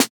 Instrument samples > Synths / Electronic
A snare one-shot made in Surge XT, using FM synthesis.